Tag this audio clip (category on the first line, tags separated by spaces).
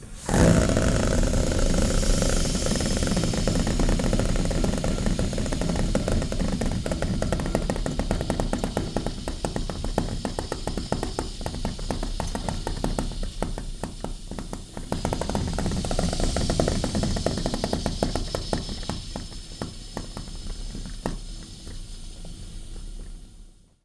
Sound effects > Other mechanisms, engines, machines
steam boiler engine field-recording furnace machine mechanical